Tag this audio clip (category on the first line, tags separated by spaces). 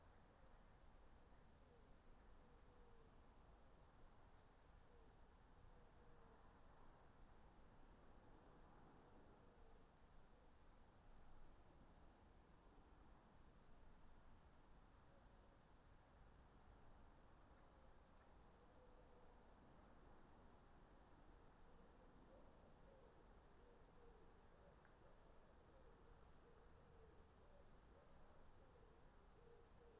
Soundscapes > Nature
alice-holt-forest artistic-intervention data-to-sound Dendrophone modified-soundscape natural-soundscape nature raspberry-pi sound-installation soundscape weather-data